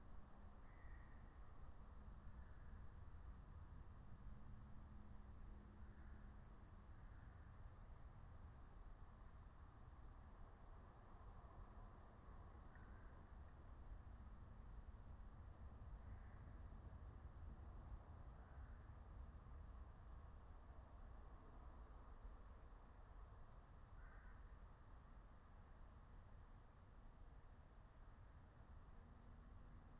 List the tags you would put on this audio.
Soundscapes > Nature
natural-soundscape
soundscape
raspberry-pi
phenological-recording
alice-holt-forest
nature
meadow
field-recording

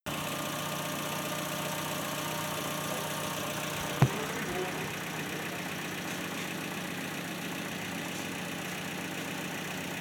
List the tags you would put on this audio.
Sound effects > Vehicles
automobile
car
engine
vehicle
motor
idling
idle

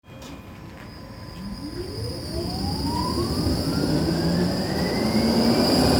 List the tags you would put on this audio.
Soundscapes > Urban
transport
tram

